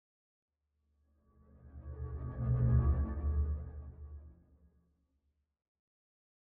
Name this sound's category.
Sound effects > Experimental